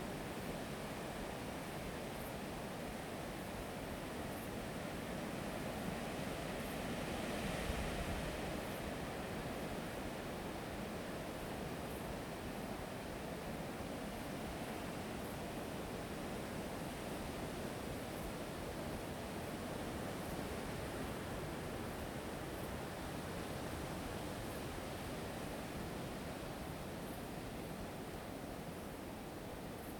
Soundscapes > Nature
2025 09 11 03h00 Foret dominale de Detain-Gergueil - Omni mode h2n Q4
Subject : Ambience recording of the Foret dominale de Detain-Gergueil using a zoom H2n in 4channel surround mode. Here combing the sounds into 2 channels in post. Date YMD : 2025 September 11 at 03:00 Location : Gergueil 21410 Bourgogne-Franche-Comte Côte-d'Or France Hardware : Zoom H2n. With a freezer bag to protect it against rain and a sock over it for a wind-cover. Mounted up a tree on a Small rig magic arm. Weather : Processing : Trimmed and normalised in Audacity, mixed the front L/R and back L/R into this "surround" recording. Notes : Tips : Multiple other recordings throughout the night.
Bourgogne, Bourgogne-Franche-Comte, country-side, countryside, H2n, late-night, outdoor, Zoom